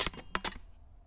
Sound effects > Other mechanisms, engines, machines
metal shop foley -164
sound, percussion, bop, strike, bam, rustle, little, perc, oneshot, tink, fx, metal, knock, bang, pop, foley, boom, shop, sfx, crackle, tools, thud, wood